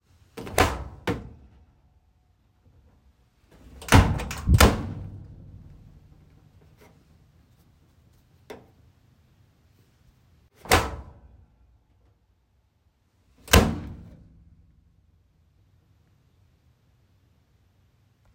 Sound effects > Objects / House appliances
Dryer Door Open & Close
Household dryer door being opened and closed.